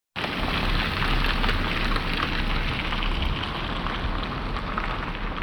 Sound effects > Vehicles
toyota corolla
Car, field-recording, Tampere